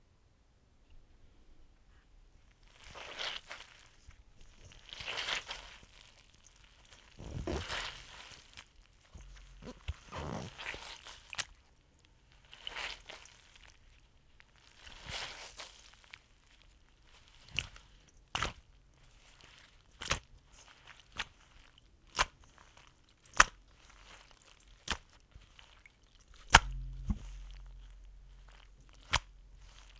Sound effects > Objects / House appliances
Wet Rag in Bowl
Took a fully wet rag and slopped it around a bowl. Great sloppy sound for layering Recorded using an external Rode video shotgun mic on a Zoom H1essential recorder
rag, slimy, sloppy, slosh, squeezing, towel, wet, wringing